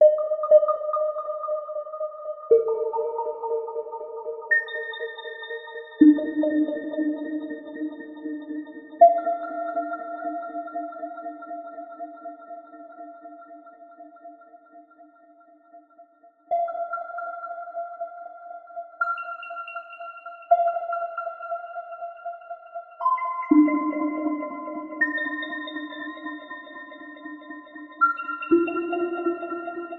Sound effects > Electronic / Design
Ambient Water Drop Reverb into the Space
Drops of water reverberating into the deep space Done with Digitakt 2, a water drop sample recorded with Tascam Portacapture X6, and Rymdigare
reverb, water, meditative, relaxing, ambient, drop